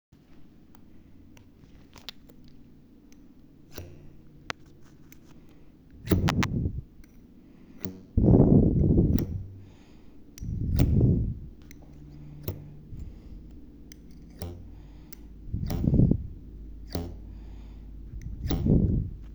Sound effects > Human sounds and actions
20250511 1617 cutlery 3 phone microphone
atmophere, recording